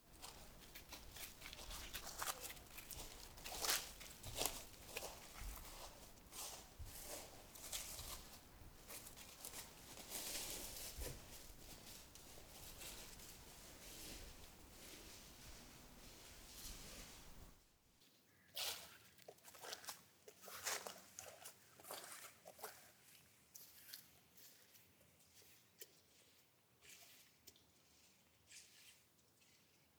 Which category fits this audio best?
Sound effects > Human sounds and actions